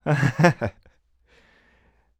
Sound effects > Human sounds and actions
Noises - Short laugh
dialogue, FR-AV2, giggle, haha, Human, laugh, laughing, Male, Man, Mid-20s, Neumann, NPC, oneshot, singletake, Single-take, talk, Tascam, U67, Video-game, Vocal, Voice-acting